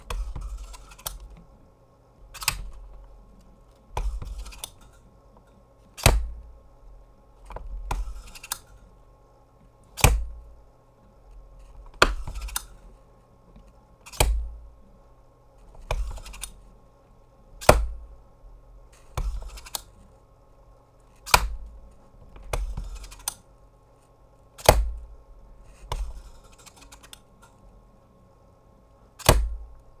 Sound effects > Objects / House appliances
OBJOffc-Blue Snowball Microphone, CU Stapler, Top, Open, Close Nicholas Judy TDC
A stapler top opening and closing.
Blue-brand, Blue-Snowball, close, foley, open, stapler, top